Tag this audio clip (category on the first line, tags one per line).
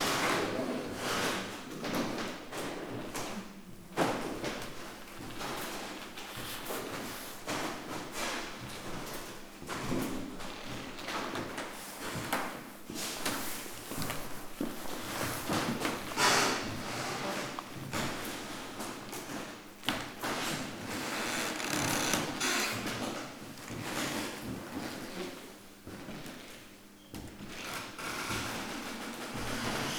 Soundscapes > Indoors
floor
old
squeaky
staircase
wood